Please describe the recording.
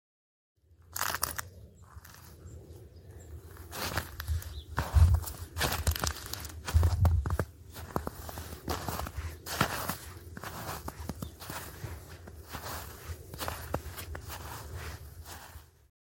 Sound effects > Human sounds and actions
Stepping on ice and walking on frozen ground and snow.